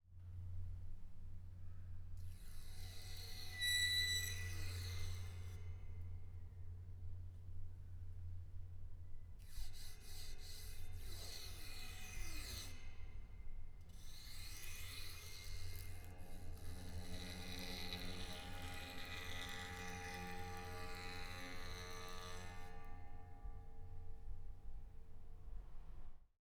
Sound effects > Other
Bowing metal stairs with cello bow 7
Bowing the metal part of the staircase in our apartment building. It's very resonant and creepy.
atmospheric, bow, eerie, effect, fx, horror, metal, scary